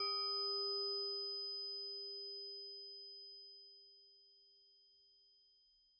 Sound effects > Objects / House appliances
Pipe Hit 1 Tone
metal, pipe, resonant